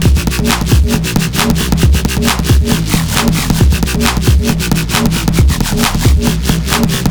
Music > Multiple instruments
Nasty Raw Techno Loop 135BPM
Quick loop made in FL11, basic random rounds pushed thru the master chain.